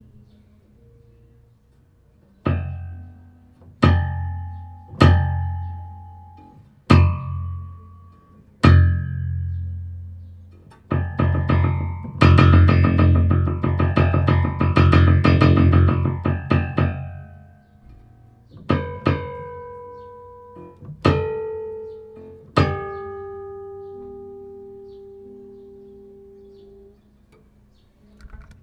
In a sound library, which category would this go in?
Instrument samples > Piano / Keyboard instruments